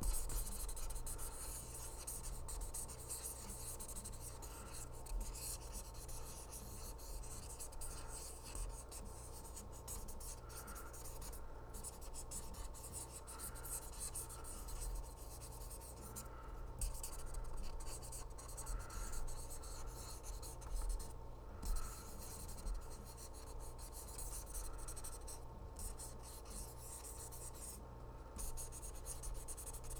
Sound effects > Objects / House appliances
OBJWrite-Blue Snowball Microphone Permanent Marker, Writing Nicholas Judy TDC
A permanent marker writing.